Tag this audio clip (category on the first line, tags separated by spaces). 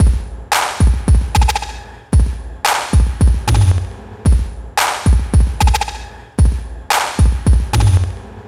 Music > Solo percussion
Analog Bleep Circuit-Bend Clap Drum Drums Electronic Hi-Hats Kick Lo-Fi Snare